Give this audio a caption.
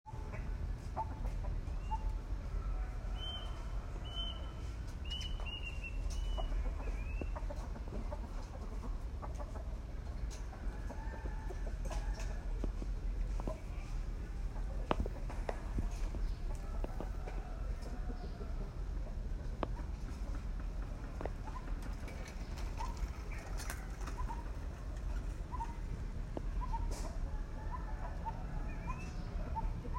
Soundscapes > Nature
Chim Chiều 2026.01.16 14:35 - Birds Afternoon
Birds afternoon. Record use iPhone 7 Plus smart phone 2026.01.16 14:35
afternoon, bird, nature